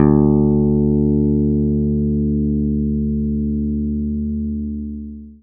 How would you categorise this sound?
Instrument samples > String